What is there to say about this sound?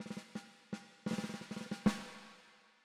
Music > Solo percussion
snare Processed - fill roll 2 - 14 by 6.5 inch Brass Ludwig
roll, flam, beat, realdrum, hits, hit, kit, ludwig, sfx, processed, snare, snaredrum, drums, drumkit, rimshots, drum, snareroll, rimshot, perc, acoustic, crack, rim, reverb, realdrums, percussion, oneshot, snares, brass, fx